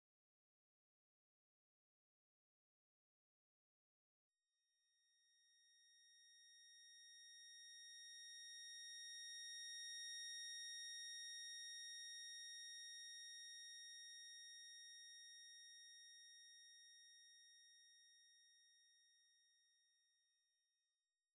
Sound effects > Electronic / Design
A high-pitched squeaking sound, resembling tinnitus effect, created using a synthesizer.
highpitch, sounddesign, atmospheric, soundeffect, tinnitus, earring, earwhistle, highfrequency